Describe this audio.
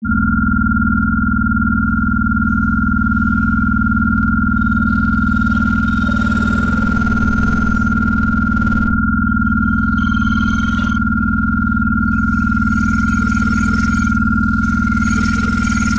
Soundscapes > Synthetic / Artificial
Grain Space 1
electronic, experimental, free, glitch, granulator, noise, packs, sample, samples, sfx, sound-effects, soundscapes